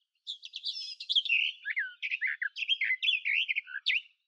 Sound effects > Animals
A recording of a Garden Warbler. Edited using RX11.
recording, Garden, nature, Bird, warbler, birdsong, UK, field